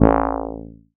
Instrument samples > Synths / Electronic
MEOWBASS 1 Db
bass, fm-synthesis